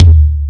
Instrument samples > Percussion
tom 1961 1 overbassed
heavy-metal, floor, DW, heavy, Tama, drumset, thrash-metal, superbass, death, overbassed, hyperbass, rock, high-cut, bass, tom-tom, unsnared, tom, deeptom, pop, sapele, overbass, bubinga, timpano, death-metal, floortom, sound-engineering, overbassized, warmtom, metal, drum